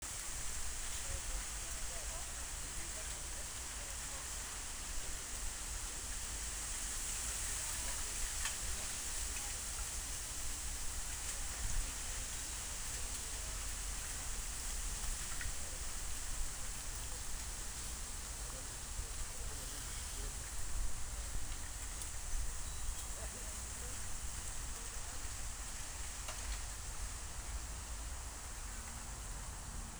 Soundscapes > Nature
Bamboo in the wind
Sounds of large bamboo stalks swaying and creaking in the wind, taken in Palmgarten Frankfurt.
ambience, bamboo, wind, natural-soundscape